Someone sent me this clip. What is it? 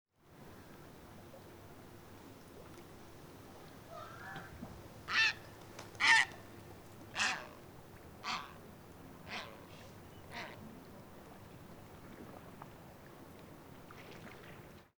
Soundscapes > Nature
08-18-25 Focus was on a heron at its nest. The heron is a very shy bird and I wanted to record it, but guess what – it stayed quietly on the nest and only once flew off to defecate (or however that would be correctly put in English), and that was the only time it vocalized. I recorded that too...
Haapsalu, Heron, Promenaadi